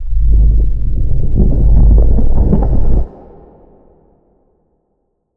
Sound effects > Natural elements and explosions
LoFi RocksGrinding Reverberated-03
Lo-Fi sound of rocks moving and scraping against each other. Stops abruptly, but reverberates. Foley emulation using wavetable synthesis.
cave, moving, scraping, stone